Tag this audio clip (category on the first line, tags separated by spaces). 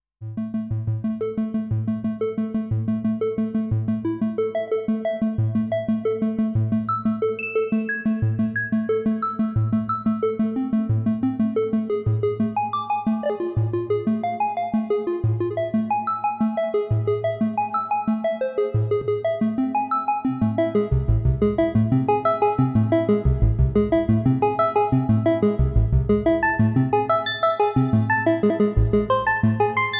Music > Solo instrument
ambient bell blip compression dreamscape meditation relax relaxation relaxing soma-terra soundscape